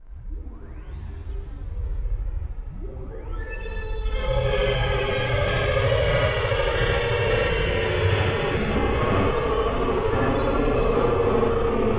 Sound effects > Electronic / Design
Murky Drowning 8
cinematic, content-creator, dark-design, dark-soundscapes, dark-techno, drowning, horror, mystery, noise, noise-ambient, PPG-Wave, science-fiction, sci-fi, scifi, sound-design, vst